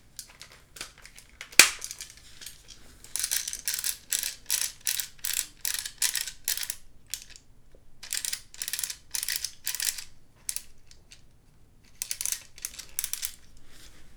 Sound effects > Objects / House appliances
Airsoft MP5 Mag charging the spring feeder - NT5 Split mono
Reminder to never point anything gun like (toy or not) at people. If you know it's empty, if you know it's safe, please still practice good gun handling and respect. Subject : A electric airsoft MP5. Date YMD : 2026 January 23 Location : France Indoors. Rode NT5 omni (Near the trigger/motor Left) NT5 Cardioid (Overhead). Weather : Processing : Trimmed and normalised in Audacity. Notes : Tips : There were 4 mics (NT5 Overhead, NT5 Motor/trigger, DJi MIC 3 trigger/motor, Dji Mic 3 barrel exit). No stereo pair really, but two recordings are grouped as a mono pair for safe-keeping and timing/sync. I suggest you mess with splitting /mixing them to mono recordings. If you know it's empty, if you know it's safe, please still practice good gun handling and respect.
Rode, spring, Airsoft, NT5, MP5, FR-AV2, Mag, Charging, Tascam, Air-soft, rotating, Mono